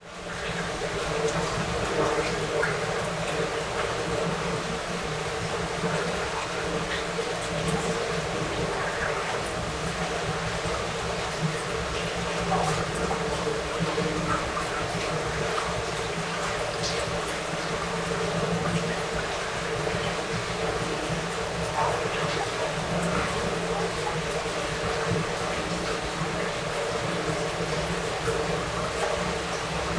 Sound effects > Natural elements and explosions
Water flowing in an Urban Stormwater drain. Moderate flow from the overflow of a Urban wetland pond.